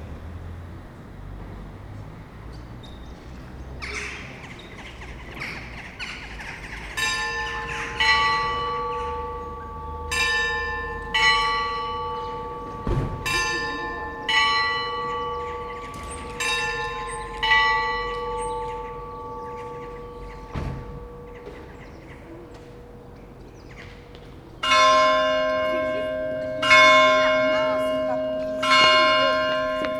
Sound effects > Other mechanisms, engines, machines
Subject : Recording a bell of the "Tour De L'horlogue" in rivesaltes Date YMD : 2025 04 02 Location : Rivesaltes 66600 Pyrénées-Orientales, Occitanie, France. Hardware : Zoom H2N MS RAW mode. Weather : Grey Sky, Little to no wind. Processing : Trimmed and Normalized in Audacity.